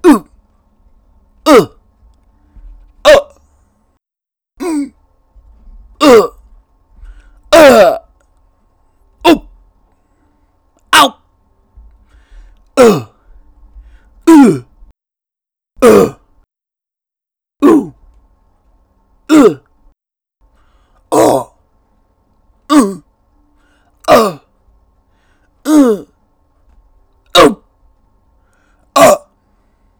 Speech > Solo speech
VOXEfrt-Blue Snowball Microphone, CU Grunts, Efforts Nicholas Judy TDC
Grunts and efforts.